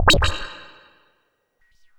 Instrument samples > Synths / Electronic
Benjolon 1 shot13
BENJOLIN, NOISE, 1SHOT, DRUM, ELECTRONICS, CHIRP, DIY, SYNTH